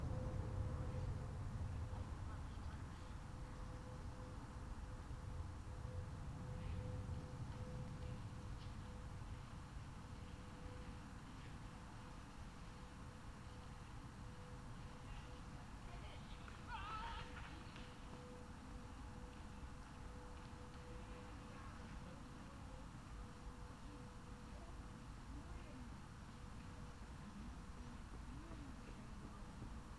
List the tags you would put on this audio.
Soundscapes > Nature
natural-soundscape
raspberry-pi
alice-holt-forest
soundscape
phenological-recording
nature
field-recording
meadow

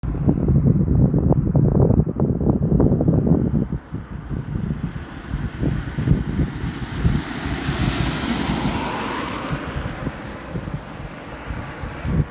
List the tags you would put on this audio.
Soundscapes > Urban
car
city
driving
tyres

